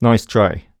Speech > Solo speech
Cocky - Nice try
Voice-acting, Video-game, Vocal, cocky, Tascam, NPC, smug, Single-take, sarcastic, talk, U67, singletake, voice, words, oneshot, Male, Mid-20s, Man, dialogue, FR-AV2, Neumann, Human